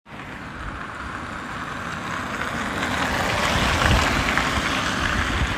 Sound effects > Vehicles
Recording of a car near a roundabout in Hervanta, Tampere, Finland. Recorded with an iPhone 14
car, outside, automobile, vehicle